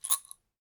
Sound effects > Natural elements and explosions
Recorded on 12.01.2026
pills, medicine, drugs, tablets